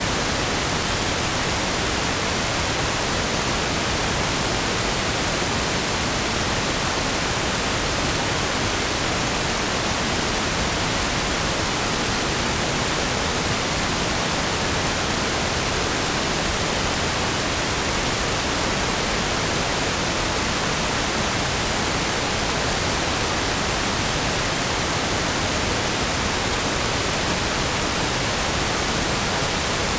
Sound effects > Natural elements and explosions
Artificial heavy rain sound effect created with the PS-20 synthesizer and Valhalla Supermassive reverb
Reverb, Storm